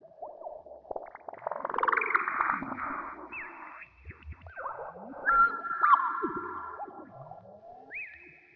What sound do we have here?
Soundscapes > Synthetic / Artificial
LFO Birsdsong 72
Birsdsong, LFO, massive